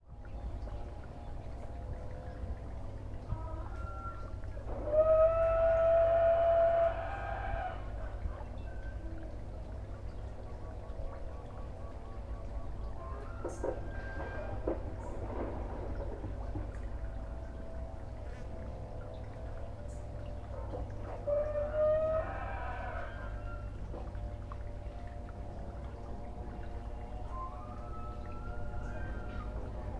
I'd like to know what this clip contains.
Soundscapes > Other
250821 134549 PH Bulldozer and chainsaws in the mangrove
Bulldozer and chainsaws in the mangrove. (Take 2) I made this recording from the side of a small road bordering a mangrove, in Tacligan (San teodoro, Oriental Mindoro, Philippines). One can hear a bulldozer working (probably in order to build a resort or something like that), while chainsaws are cutting trees in the mangrove. In the background, some breeze in the trees, a small water stream, cicadas, some birds, and distant voices. Recorded in August 2025 with a Zoom H5studio (built-in XY microphones). Fade in/out applied in Audacity.